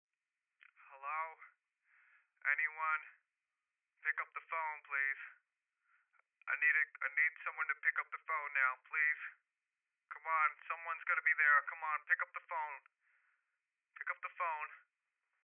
Sound effects > Human sounds and actions
Phone Call Man 1 2
alert, bizarre, call, cell, cellphone, Creepy, enormous, Help, Horror, indie, mobile, phone, phonecall, spooky, telephone, thriller